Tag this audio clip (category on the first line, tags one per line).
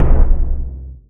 Instrument samples > Percussion
bass
basstom
deeptom
drum
drums
floor